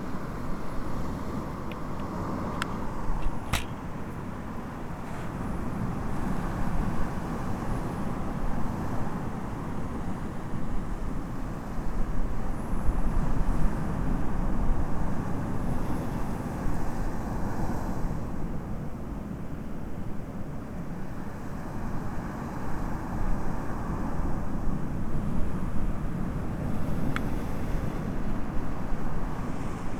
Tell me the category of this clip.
Soundscapes > Nature